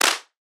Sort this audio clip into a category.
Instrument samples > Synths / Electronic